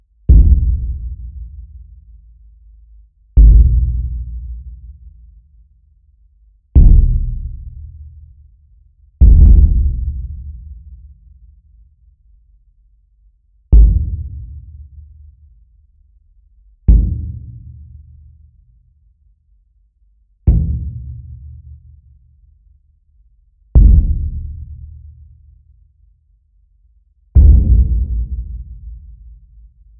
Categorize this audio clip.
Sound effects > Other mechanisms, engines, machines